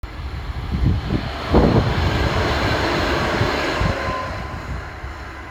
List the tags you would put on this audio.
Soundscapes > Urban
field-recording railway Tram

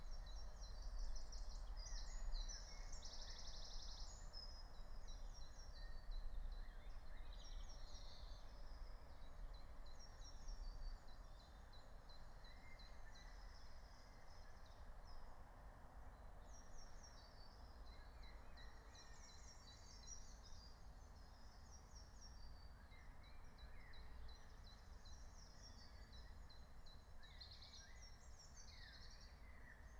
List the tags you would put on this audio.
Soundscapes > Nature
soundscape alice-holt-forest natural-soundscape phenological-recording raspberry-pi nature field-recording meadow

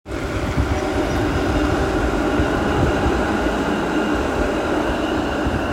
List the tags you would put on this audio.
Sound effects > Vehicles
tramway vehicle outside tram

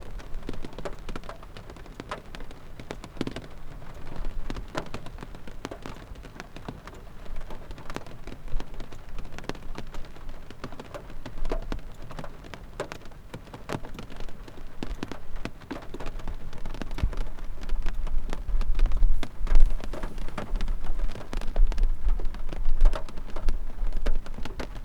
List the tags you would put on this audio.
Soundscapes > Nature
autumn car field-recording glass rain raining water weather windscreen windshield

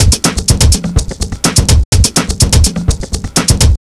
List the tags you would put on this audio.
Music > Other
drumbeat break beat drumloop IDM breakbeats drums loop

